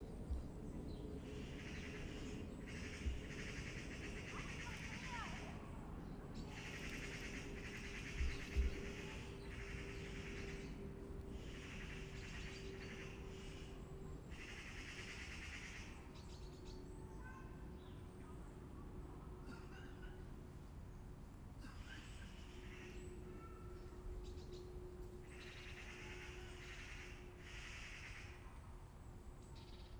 Soundscapes > Nature
Recorded in a densely-forested park during the day, some birds and general park ambience with some people chatting in a background. Recorded with Reynolds 2nd Order Ambisonics microphone, the audio file has 9 tracks, already encoded into B-Format Ambisonics. Can be encoded into binaural format.